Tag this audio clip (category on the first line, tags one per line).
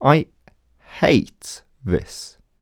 Speech > Solo speech
dialogue
displeasure
displeasured
FR-AV2
hate
Human
Male
Man
Mid-20s
Neumann
NPC
oneshot
sentence
singletake
Single-take
talk
Tascam
U67
unpleased
Video-game
Vocal
voice
Voice-acting
Words